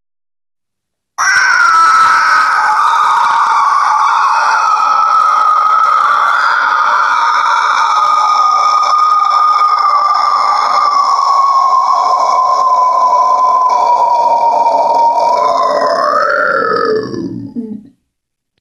Speech > Solo speech
ryk - scream
recorded at mobil phone
phone, scream, voice